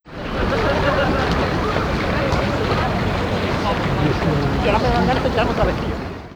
Soundscapes > Urban
071 PEDROINESBRIDGE TOURISTS WATER-PLAY 2
Sounds recorded between Jardim Botânico and Convento de São Francisco (Coimbra, Portugal, 2018). Recorded with Zoom H4n mk1, using either built-in mics, Røde shotgun (I forgot which model...) and different hydrophones built by Henrique Fernandes from Sonoscopia.
play, tourists, water